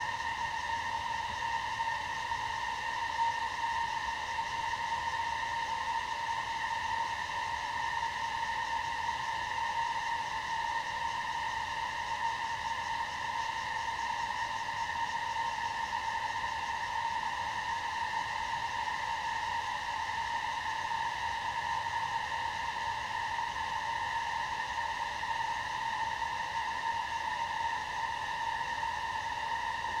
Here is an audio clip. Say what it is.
Soundscapes > Urban
wind through the antennas day ambience 1
Northern Cross Radio Telescope ambience recorded with zoom h6 at Medicina Radio Observatory
ambience, antenna, antennas